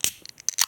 Sound effects > Objects / House appliances
Popping a soda can. Recorded with Dolby On app, from my phone.
beer, pop